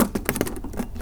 Sound effects > Other mechanisms, engines, machines
Woodshop Foley-008
thud, oneshot, crackle, bang, bam, pop, fx, rustle